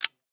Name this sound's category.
Sound effects > Human sounds and actions